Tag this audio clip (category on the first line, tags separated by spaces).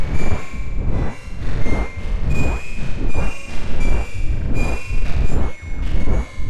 Sound effects > Electronic / Design
sound-design commons noise scifi free sci-fi industrial-noise royalty creative